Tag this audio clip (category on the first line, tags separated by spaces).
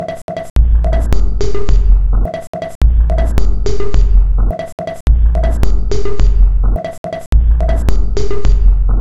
Instrument samples > Percussion
Alien Ambient Drum Samples Weird Loopable Soundtrack Packs Loop Dark Industrial Underground